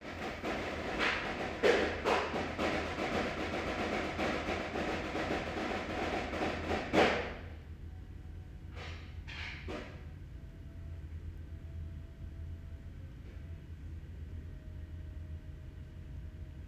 Soundscapes > Other
Drums practicing in echoey hallway
Recorded by my iPhone 13 using voice memos. This is a recording of our practice hall when percussionists were practicing. Marching snares.
Ambience, Music-building, Percussion, snare